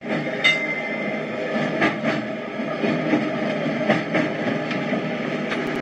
Sound effects > Vehicles
tram sounds emmanuel 12
23, line